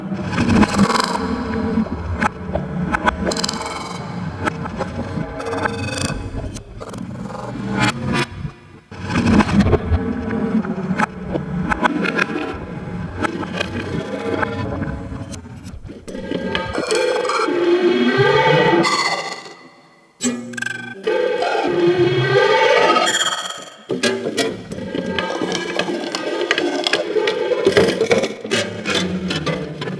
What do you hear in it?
Sound effects > Experimental
Konkret Jungle 7
From a pack of samples focusing on ‘concrete’ and acousmatic technique (tape manipulation, synthetic processing of natural sounds, extension of “traditional” instruments’ timbral range via electronics). This excerpt is a tape-collage using splices of bowed cymbal and other random foley noises, combining some more modern comb filter feedback with the "vintage" tape manipulation effects.
acousmatic, bowed-cymbal, comb-filter, extended-technique, musique-concrete, objet-sonore, slicing, tape-manipulation